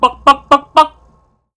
Sound effects > Human sounds and actions
TOONAnml-Blue Snowball Microphone, CU Rooster, Cluck Nicholas Judy TDC
A rooster cluck. Vocal.
Blue-brand, cartoon, vocal, Blue-Snowball, rooster, cluck